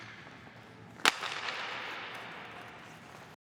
Sound effects > Other
Ice Hockey Sound Library Slapshot2
Taking a fully wound-up slapshot, recorded from defense's perspective.
Action, Ice-Hockey, Sports